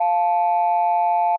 Instrument samples > Synths / Electronic
Landline Phonelike Synth F6
I was messing around in FL Studio using a tool/synth called Fluctus. It's basically a synth which can produce up to 3 concurrent tones. With two sine waves, the second of which tuned to a just-intuned minor 3rd (386 cents) above the first tone, each tone makes a sort of "holding tone" that is reminiscent of land-line phones.
Landline-Holding-Tone, JI, Landline-Telephone, Landline-Phone, Landline, JI-Third, just-minor-3rd, Holding-Tone, JI-3rd, Old-School-Telephone, Landline-Telephone-like-Sound, just-minor-third, Tone-Plus-386c, Synth, Landline-Phonelike-Synth